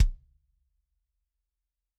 Instrument samples > Percussion
Kickdrum sample ready to use in the Roland TM-2. This is an own recorded sample.
DRUMKIT, KICK, KICKDRUM, SAMPLE